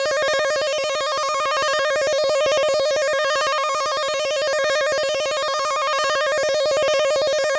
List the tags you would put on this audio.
Sound effects > Electronic / Design
8-bit
clip
fx
game

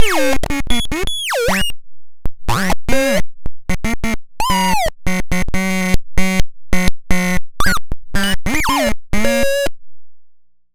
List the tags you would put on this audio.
Sound effects > Electronic / Design
Alien
Analog
Bass
Digital
DIY
Electro
Electronic
Experimental
FX
Glitch
Glitchy
Handmadeelectronic
Infiltrator
Instrument
Noise
noisey
Optical
Otherworldly
Robot
Robotic
Sci-fi
SFX
Spacey
Sweep
Synth
Theremins
Trippy

